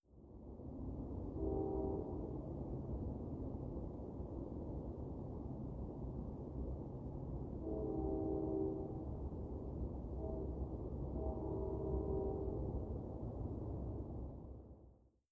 Sound effects > Vehicles
A train horn in the distance.